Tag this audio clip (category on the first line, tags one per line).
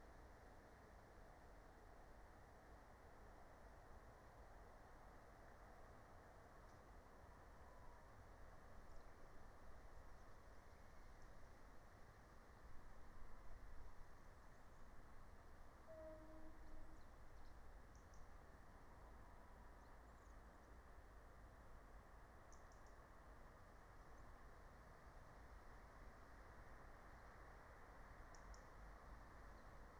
Soundscapes > Nature
alice-holt-forest
meadow
natural-soundscape
nature